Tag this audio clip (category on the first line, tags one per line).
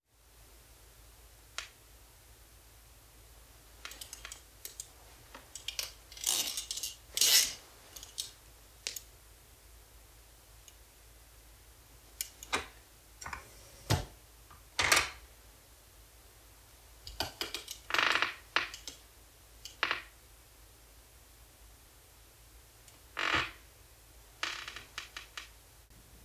Sound effects > Other
chair
creak
desk
office
squeak